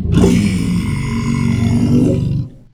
Sound effects > Experimental
Creature Monster Alien Vocal FX-43
Reverberating, Frightening, Sounddesign, sfx, evil, visceral, Deep, Otherworldly, Creature, Monster, Groan, demon, Ominous, Snarl, gutteral, Fantasy, gamedesign, Vox, boss, Animal, Growl, Echo, scary, Alien, Monstrous, Sound, devil, fx